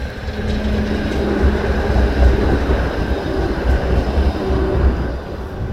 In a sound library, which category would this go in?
Sound effects > Vehicles